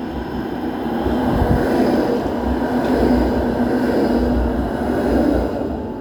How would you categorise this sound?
Sound effects > Vehicles